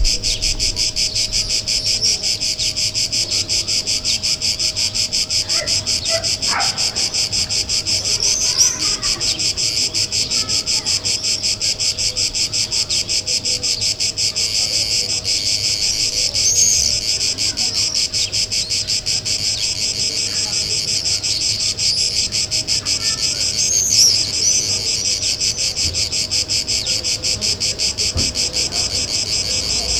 Sound effects > Animals
250710 20h38 Gare Train - Grand-arbre - MKE600
Sennheiser MKE600 with stock windcover P48, no filter. Weather : Clear sky, little wind. Processing : Trimmed in Audacity.